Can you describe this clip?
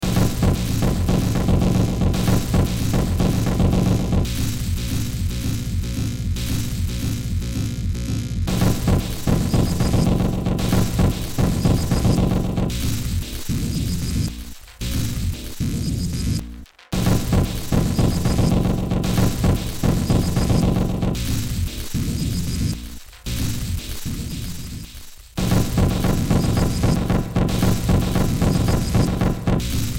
Music > Multiple instruments
Short Track #3689 (Industraumatic)
Horror, Games, Underground, Noise, Cyberpunk, Soundtrack, Ambient, Sci-fi, Industrial